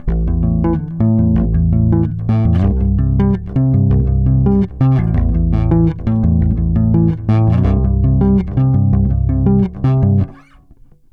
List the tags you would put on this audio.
Instrument samples > String
mellow
rock
charvel
funk
loop

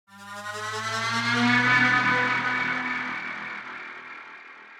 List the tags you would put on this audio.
Sound effects > Electronic / Design
abstract
effect
efx
electric
fx
psy
psyhedelic
psytrance
sci-fi
sfx
sound
sound-design
sounddesign
soundeffect

